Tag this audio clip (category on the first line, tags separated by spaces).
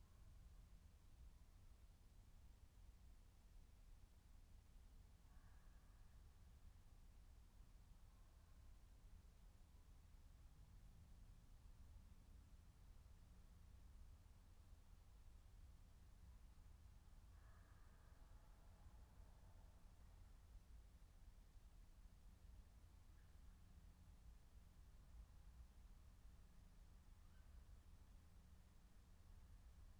Soundscapes > Nature
meadow nature field-recording alice-holt-forest